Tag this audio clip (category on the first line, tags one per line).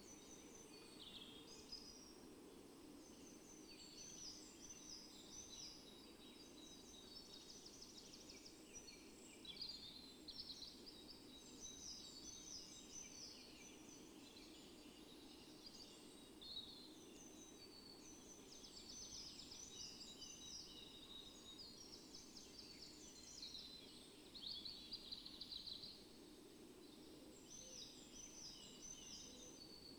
Soundscapes > Nature
sound-installation
field-recording